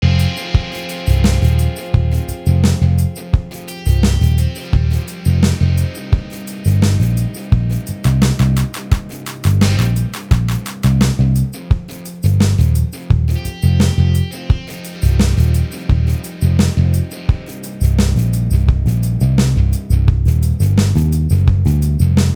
Music > Multiple instruments
Desert loop
Electric guitar, acoustic bass, acoustic drums. can be used for a desert in a video game. my first song. 90 bpm, C major, 4/4 time. not AI generated.
90bpm, acoustic, cinematic, Cmajor, drum, game, Guitar, movie, rock